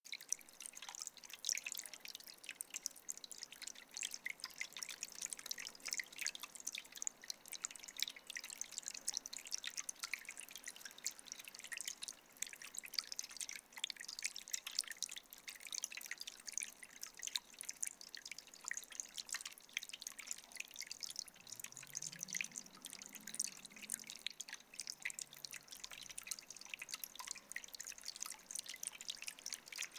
Sound effects > Objects / House appliances
pets water fountain thirst
A cat water fountain near a refrigerator hum.
bubbling, flow, fountain, liquid, water